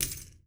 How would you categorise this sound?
Sound effects > Objects / House appliances